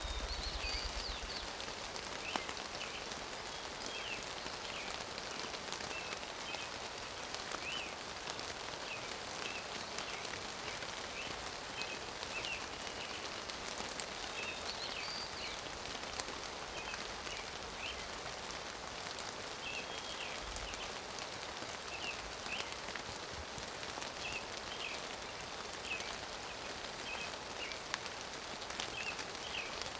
Soundscapes > Nature
Light rain falls at the edge of a meadow surrounded by forest. Morning birds call, robins, sparrows, varied thrush, ruffed grouse and others.
wet, birds, weather, field-recording